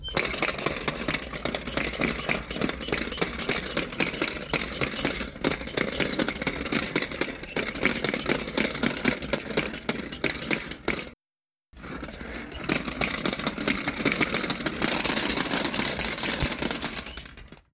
Sound effects > Objects / House appliances
A corn popper push toy. Recorded at Goodwill.